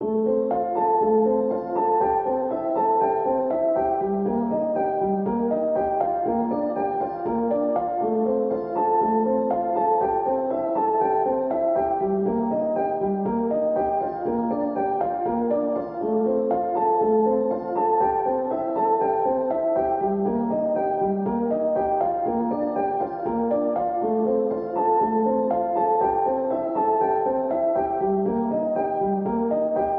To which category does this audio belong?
Music > Solo instrument